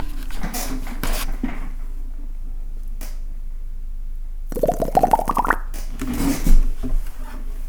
Sound effects > Other mechanisms, engines, machines
shop foley-001
bam, bang, boom, bop, crackle, foley, fx, knock, little, metal, oneshot, perc, percussion, pop, rustle, sfx, shop, sound, strike, thud, tink, tools, wood